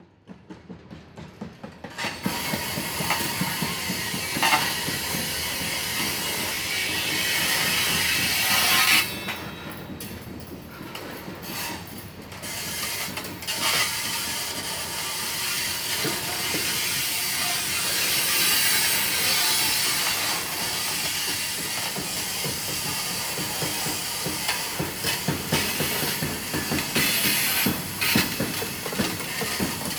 Sound effects > Vehicles
A freight train slowly coming into a freight yard. It is coming around a curve so it is very, very screechy and loud.
city, field-recording, freight, heavy, locomotive, loud, metallic, noise, railroad, rumble, screech, train, transportation, urban